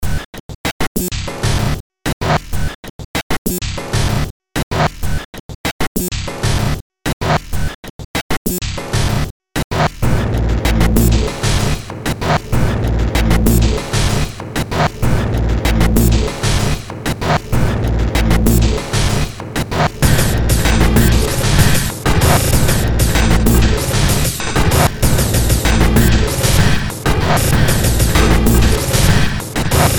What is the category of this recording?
Music > Multiple instruments